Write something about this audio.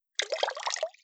Sound effects > Natural elements and explosions
Pouring Water Short Free
Briefly pouring water into some more water.
into, filling, fill, pouring